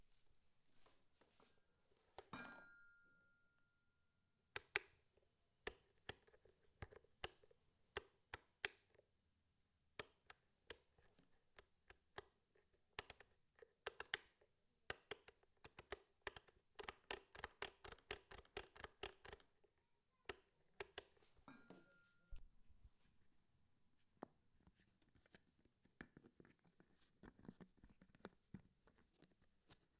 Sound effects > Objects / House appliances
Button Pressing, plastic
The plastic pump of a hand sanitizer bottle
button, handle, spring